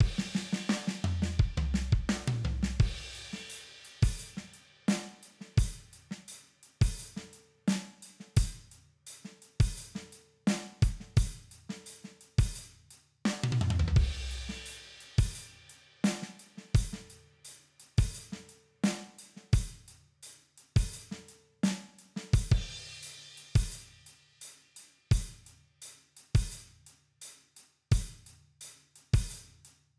Music > Solo instrument
86 bpm drums
Some noise I cooked up and other parts assembled in Logic Pro. Steal any stems. Or if you're trying to make music, hit me up! I'll actually make something of quality if you check out my other stuff.
bpm, beat, percussion, drums, loop, drum, groovy